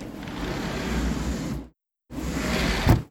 Sound effects > Objects / House appliances
DOORAppl-Samsung Galaxy Smartphone, CU Coca Cola Vending Machine, Sliding Door, Open, Close Nicholas Judy TDC
A Coca-Cola vending machine sliding door. Recorded at The Home Depot.
close, coca-cola, door, foley, open, Phone-recording, slide, sliding-door, vending-machine